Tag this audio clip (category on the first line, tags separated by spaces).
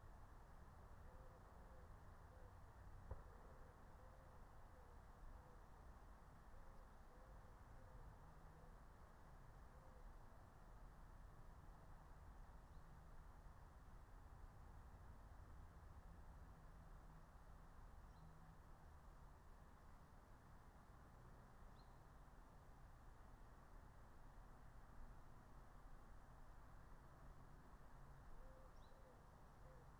Soundscapes > Nature
raspberry-pi meadow phenological-recording natural-soundscape soundscape alice-holt-forest field-recording nature